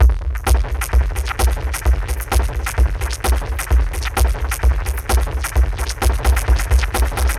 Music > Solo percussion
130 CR5000 Loop 01
A handful of samples and drum loops made with Roland CR5000 drum machine. Check the whole pack for more
130bpm, 80s, Analog, AnalogDrum, Beat, CompuRhythm, CR5000, Drum, DrumMachine, Drums, Electronic, Loop, music, Roland, Synth, Vintage